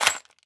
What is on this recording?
Sound effects > Other mechanisms, engines, machines
Short Shake 03
garage, noise, sample, shake